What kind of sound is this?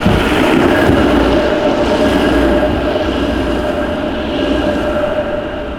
Sound effects > Vehicles
Tram00075360TramPassingBy
city, field-recording, tram, tramway, transportation, vehicle, winter